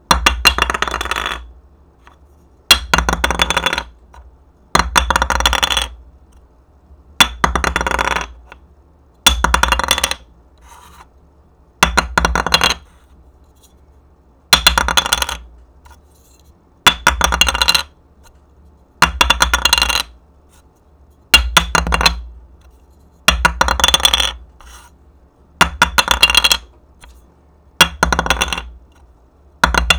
Sound effects > Objects / House appliances
PLASImpt-Blue Snowball Microphone, CU Item, Plastic, Drop Nicholas Judy TDC
A plastic item drop.
Blue-brand, Blue-Snowball, drop, foley, item, plastic